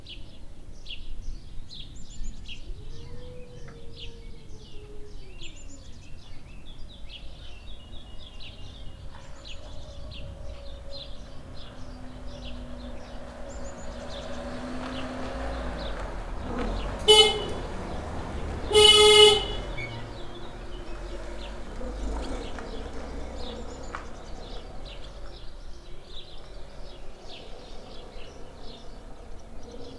Sound effects > Vehicles
Subject : A car honking in Gergueil Date YMD : 2025 04 27 17h03 Location : Gergueil France. Hardware : Zoom H5 stock XY capsule. Weather : Processing : Trimmed and Normalized in Audacity.